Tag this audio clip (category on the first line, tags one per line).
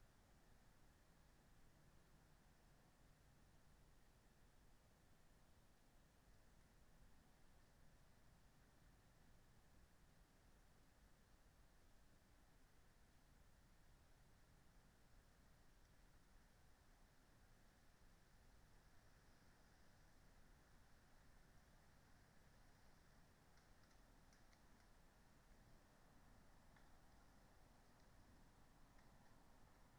Soundscapes > Nature
soundscape
field-recording
nature
data-to-sound
Dendrophone
phenological-recording
sound-installation
weather-data
alice-holt-forest
natural-soundscape
artistic-intervention
modified-soundscape
raspberry-pi